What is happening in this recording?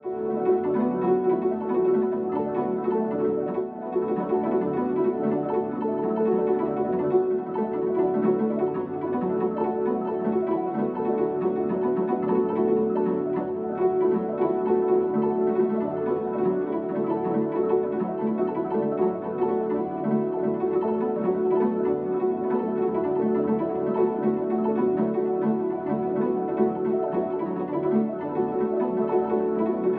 Soundscapes > Synthetic / Artificial
Botanica-Granular Ambient 7
Ambient, Atomosphere, Beautiful, Botanica, Botanical